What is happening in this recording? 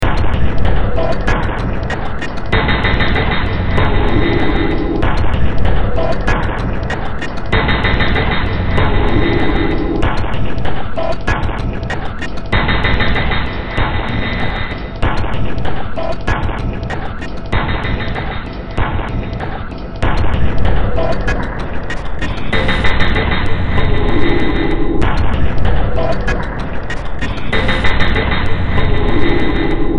Music > Multiple instruments
Demo Track #3154 (Industraumatic)
Ambient, Cyberpunk, Games, Horror, Industrial, Noise, Sci-fi, Soundtrack, Underground